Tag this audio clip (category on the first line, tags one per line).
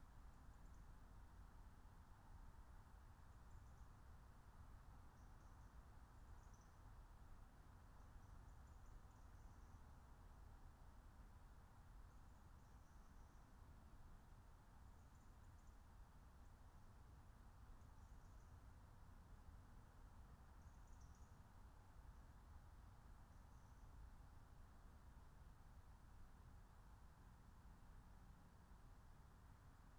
Soundscapes > Nature
natural-soundscape
soundscape
meadow
phenological-recording
nature
field-recording
raspberry-pi
alice-holt-forest